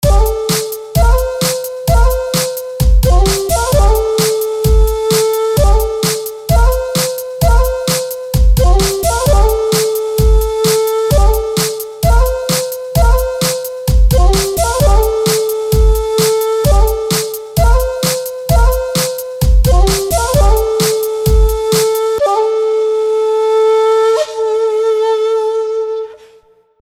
Music > Solo instrument
Musical Composition
Slap, Snare, Musical, Clap, Dance, Loop, Drums, Kick, Bass, EDM, Electro, House, Composition, Free, Music, Drum